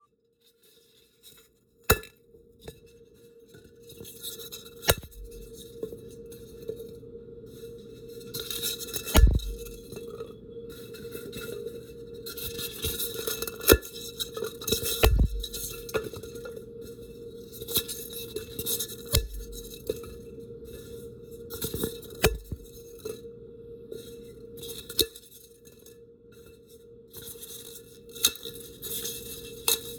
Sound effects > Other
Breaking small styrofoam pieces. My cat HATES it. LOL Recorded on iPhone 16e. Placed it inside a small cookie jar with no lid for a bit of natural reverb.
Annoying, Funny, Random, Styrofoam, Sueaks